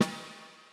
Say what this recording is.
Music > Solo percussion
Snare Processed - Oneshot 222 - 14 by 6.5 inch Brass Ludwig
rim, realdrums, snare